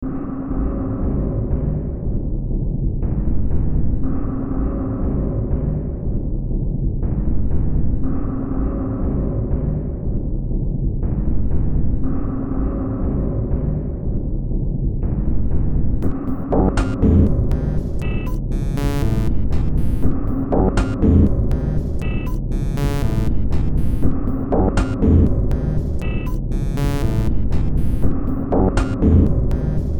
Music > Multiple instruments
Demo Track #3608 (Industraumatic)
Games, Ambient, Horror, Noise, Soundtrack, Underground, Cyberpunk, Industrial, Sci-fi